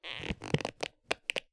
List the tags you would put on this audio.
Sound effects > Objects / House appliances
Rubbing
Pleather
Shoes

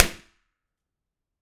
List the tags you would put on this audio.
Sound effects > Other
Balloon
Bedroom
ECM-999
ECM999
FR-AV2
Home
Impulse
Impulse-And-Response
Impulse-Response
Medium
Omni
one-thrid
Popping-Balloon
Rectangular
Response
Superlux
Tascam

